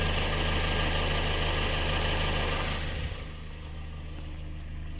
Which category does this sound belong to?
Sound effects > Other mechanisms, engines, machines